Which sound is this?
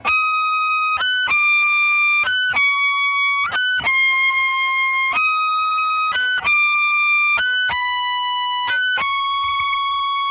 Music > Solo instrument
guitar high melodie
melodic, spacy, loop